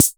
Instrument samples > Percussion
Welson-SnareDrum 01
DrumLoop, DrumMachine, Drums, Electro, Electronic, Hi-Hats, Rare, Retro, Snare, Synth, Vintage